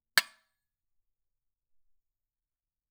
Sound effects > Other mechanisms, engines, machines
Woodshop Foley-068
bam, bang, boom, bop, crackle, foley, fx, knock, little, metal, perc, percussion, pop, sfx, shop, strike, thud, tink, tools, wood